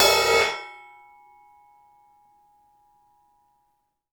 Music > Solo instrument
Sabian 15 inch Custom Crash-7
15inch, Crash, Custom, Cymbal, Cymbals, Drum, Drums, Kit, Metal, Oneshot, Perc, Percussion, Sabian